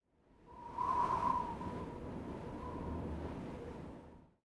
Sound effects > Natural elements and explosions
cartoon wind embarrassed
This sound file is a short wind sound, it is for embarrassed pause scene.
cartoon, comic, nature, wind